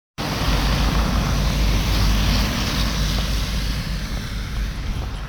Soundscapes > Urban
Car with studded tires recorded on phone